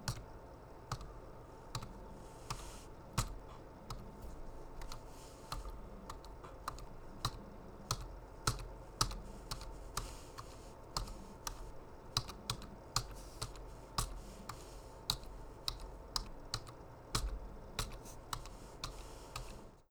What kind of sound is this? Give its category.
Sound effects > Objects / House appliances